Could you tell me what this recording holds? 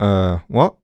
Speech > Solo speech
Confused - Ehh what
singletake, Neumann, Single-take, U67, Human, Man, Video-game, Mid-20s, dialogue, Male, Vocal